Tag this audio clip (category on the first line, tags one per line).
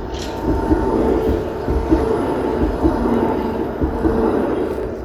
Sound effects > Vehicles
transportation
tramway
vehicle